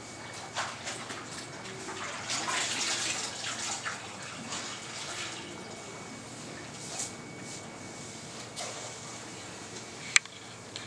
Sound effects > Other
Mild spin mop with dripping and passing over floor. Recorded with Zoom H4n Pro.
Water, Pail, Spin, Mop